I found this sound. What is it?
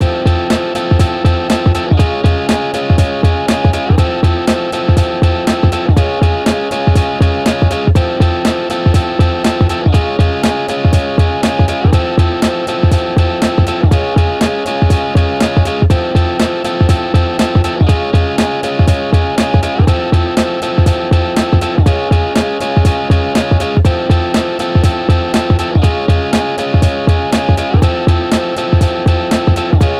Music > Solo instrument
Guitar loops 124 14 verison 14 120.8 bpm
Guitar beat . VST plugins used . This sound can be combined with other sounds in the pack. Otherwise, it is well usable up to 4/4 120.8 bpm.
bpm, electric, electricguitar, free, guitar, loop, music, reverb, samples, simple, simplesamples